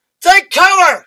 Speech > Solo speech
Soldier Yelling Take cover
Soldier yelling to Take Cover